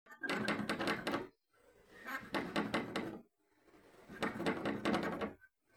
Sound effects > Objects / House appliances
Door knocking
Door knock sound recording from mobile phone